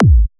Instrument samples > Synths / Electronic
Psytrance Kick 03
Psytrance Sample Packs